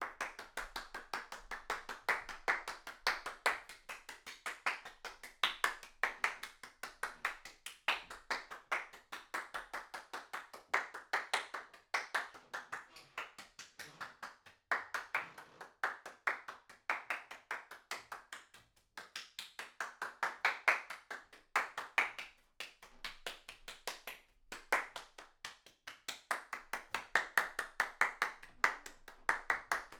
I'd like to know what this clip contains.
Sound effects > Human sounds and actions
Applause walking around room 3
Applaud, Applauding, Applause, AV2, clapping, FR-AV2, individual, indoor, NT5, person, Rode, solo, Solo-crowd, Tascam, XY